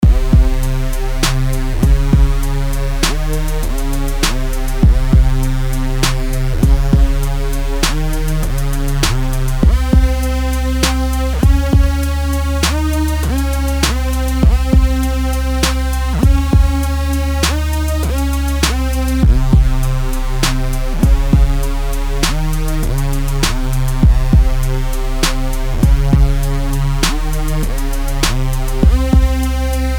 Music > Other
I am just a beginner in flstudio, I try experimenting with some things and I can make some really basic beats